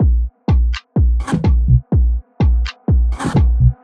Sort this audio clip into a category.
Music > Multiple instruments